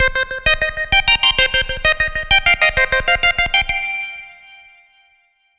Instrument samples > Synths / Electronic
This is a melody made on Sytrus with the presets of bells with the variation of 1/3 beat Gate on the gross beat.